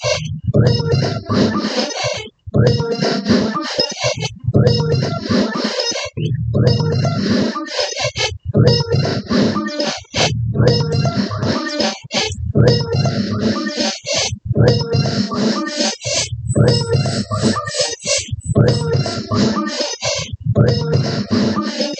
Sound effects > Electronic / Design
Stirring The Rhythms 17
noise-ambient, sci-fi, sound-design